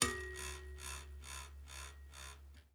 Sound effects > Other mechanisms, engines, machines
Heavy Spring 02
garage, noise, sample, spring